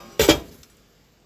Sound effects > Objects / House appliances
shot-Bafflebanging-2
Banging and hitting 1/8th inch steel baffles and plates